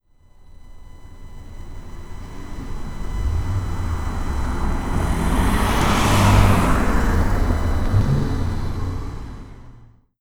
Sound effects > Vehicles
VEHBy-Tascam DR05 Recorder, CU Toyota Highlander, Pass By Nicholas Judy TDC
A Toyota Highlander passing by.
car, pass-by, Tascam-brand, Tascam-DR-05, Tascam-DR05, toyota-highlander